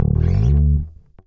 Music > Solo instrument
slide up to note
pluck, slide, fuzz, slap, bassline, chuny, chords, slides, riffs, pick, harmonics, bass, basslines, funk, electric, riff, note, rock, lowend, electricbass, notes, blues, low, harmonic